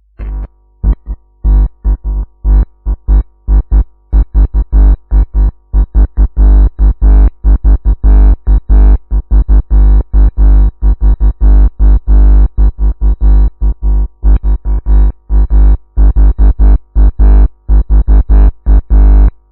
Sound effects > Experimental
Automotive Circuit Tester Reduced
Automotive circuit tester hooked up to RCA to create experimental touch bass, sound quality reduced with Cool Edit